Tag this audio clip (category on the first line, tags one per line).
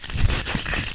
Sound effects > Other
medium
spell
ice